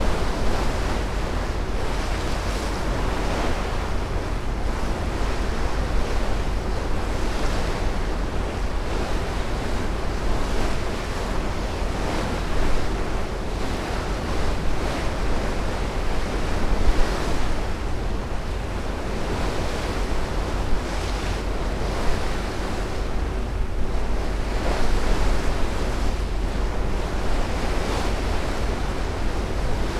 Sound effects > Other
250801 195708 PH Ship travelling in the seas
Ship travelling in the seas. (Take 1) I made this recording on a ferryboat travelling between Calapan city and Batangas city (Philippines), pointing the recorder to the bow of the ship. One can hear the sea waves on the hull, and the engine/machinery of the ship in the background. Recorded in August 2025 with a Zoom H5studio (built-in XY microphones). Fade in/out applied in Audacity.
ferry, field-recording, motorboat, ship, travelling, trip, water